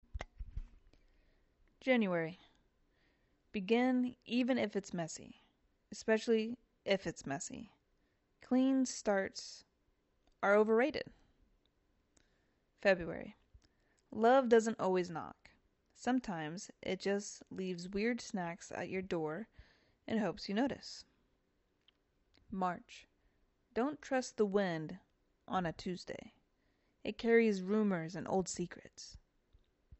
Speech > Solo speech
Calendar Wisdom – Vol. 1
A monthly dose of surreal and soulful wisdom—perfect for daily inspiration, audio reels, or comfort playlists. January “Begin, even if it’s messy. Especially if it’s messy. Clean starts are overrated.” February “Love doesn’t always knock. Sometimes it just leaves weird snacks at your door and hopes you notice.” March “Don’t trust the wind on a Tuesday. It carries rumors and old secrets.” April “It’s okay to bloom late. The moon doesn’t show up ‘til nightfall, and no one complains.” May “Water your weird. Seriously. Whatever makes you strange might just make you brilliant.” June “Sunshine is free, naps are legal, and you are allowed to feel good for no reason at all.” July “You are not behind. Time isn’t a race—it’s a dance. And you’ve got great moves.” August “Sweat is just your body crying happy tears because you’re alive and moving. Hydrate.” September “If you’re lost, ask the trees. They’ve been standing still long enough to notice everything.”
calendarwisdom, voiceaffirmations, Voiceover, weirdadvice